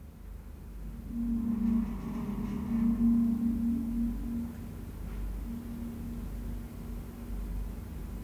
Sound effects > Other mechanisms, engines, machines
Aircon groan
Metal pipe noise of an aircon system in a scientific building. Recorded on an iPhone 12 Pro.
eeire, groan, metallic